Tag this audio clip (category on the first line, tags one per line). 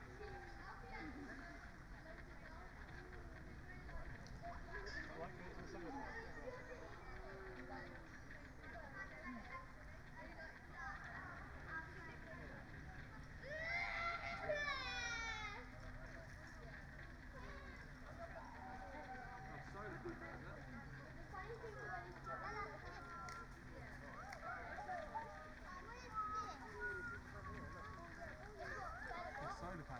Soundscapes > Nature
phenological-recording
field-recording
artistic-intervention
sound-installation
data-to-sound
alice-holt-forest
natural-soundscape
weather-data
nature
raspberry-pi
Dendrophone
soundscape
modified-soundscape